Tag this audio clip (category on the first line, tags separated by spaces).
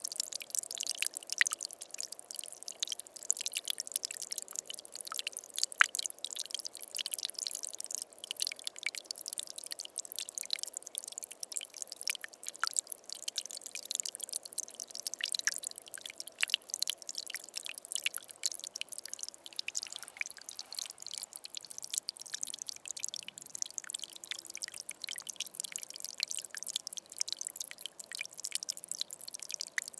Soundscapes > Nature
creek river stream Water waterstream